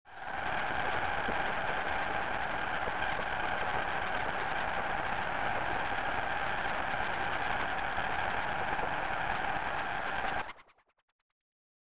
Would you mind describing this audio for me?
Sound effects > Electronic / Design
Broken radio sound effect or walkie talkie whatever you call it. - and i know it kind of looks wrong, i mean the way the audio waves are upside down. ( let it be uploaded pls 😂)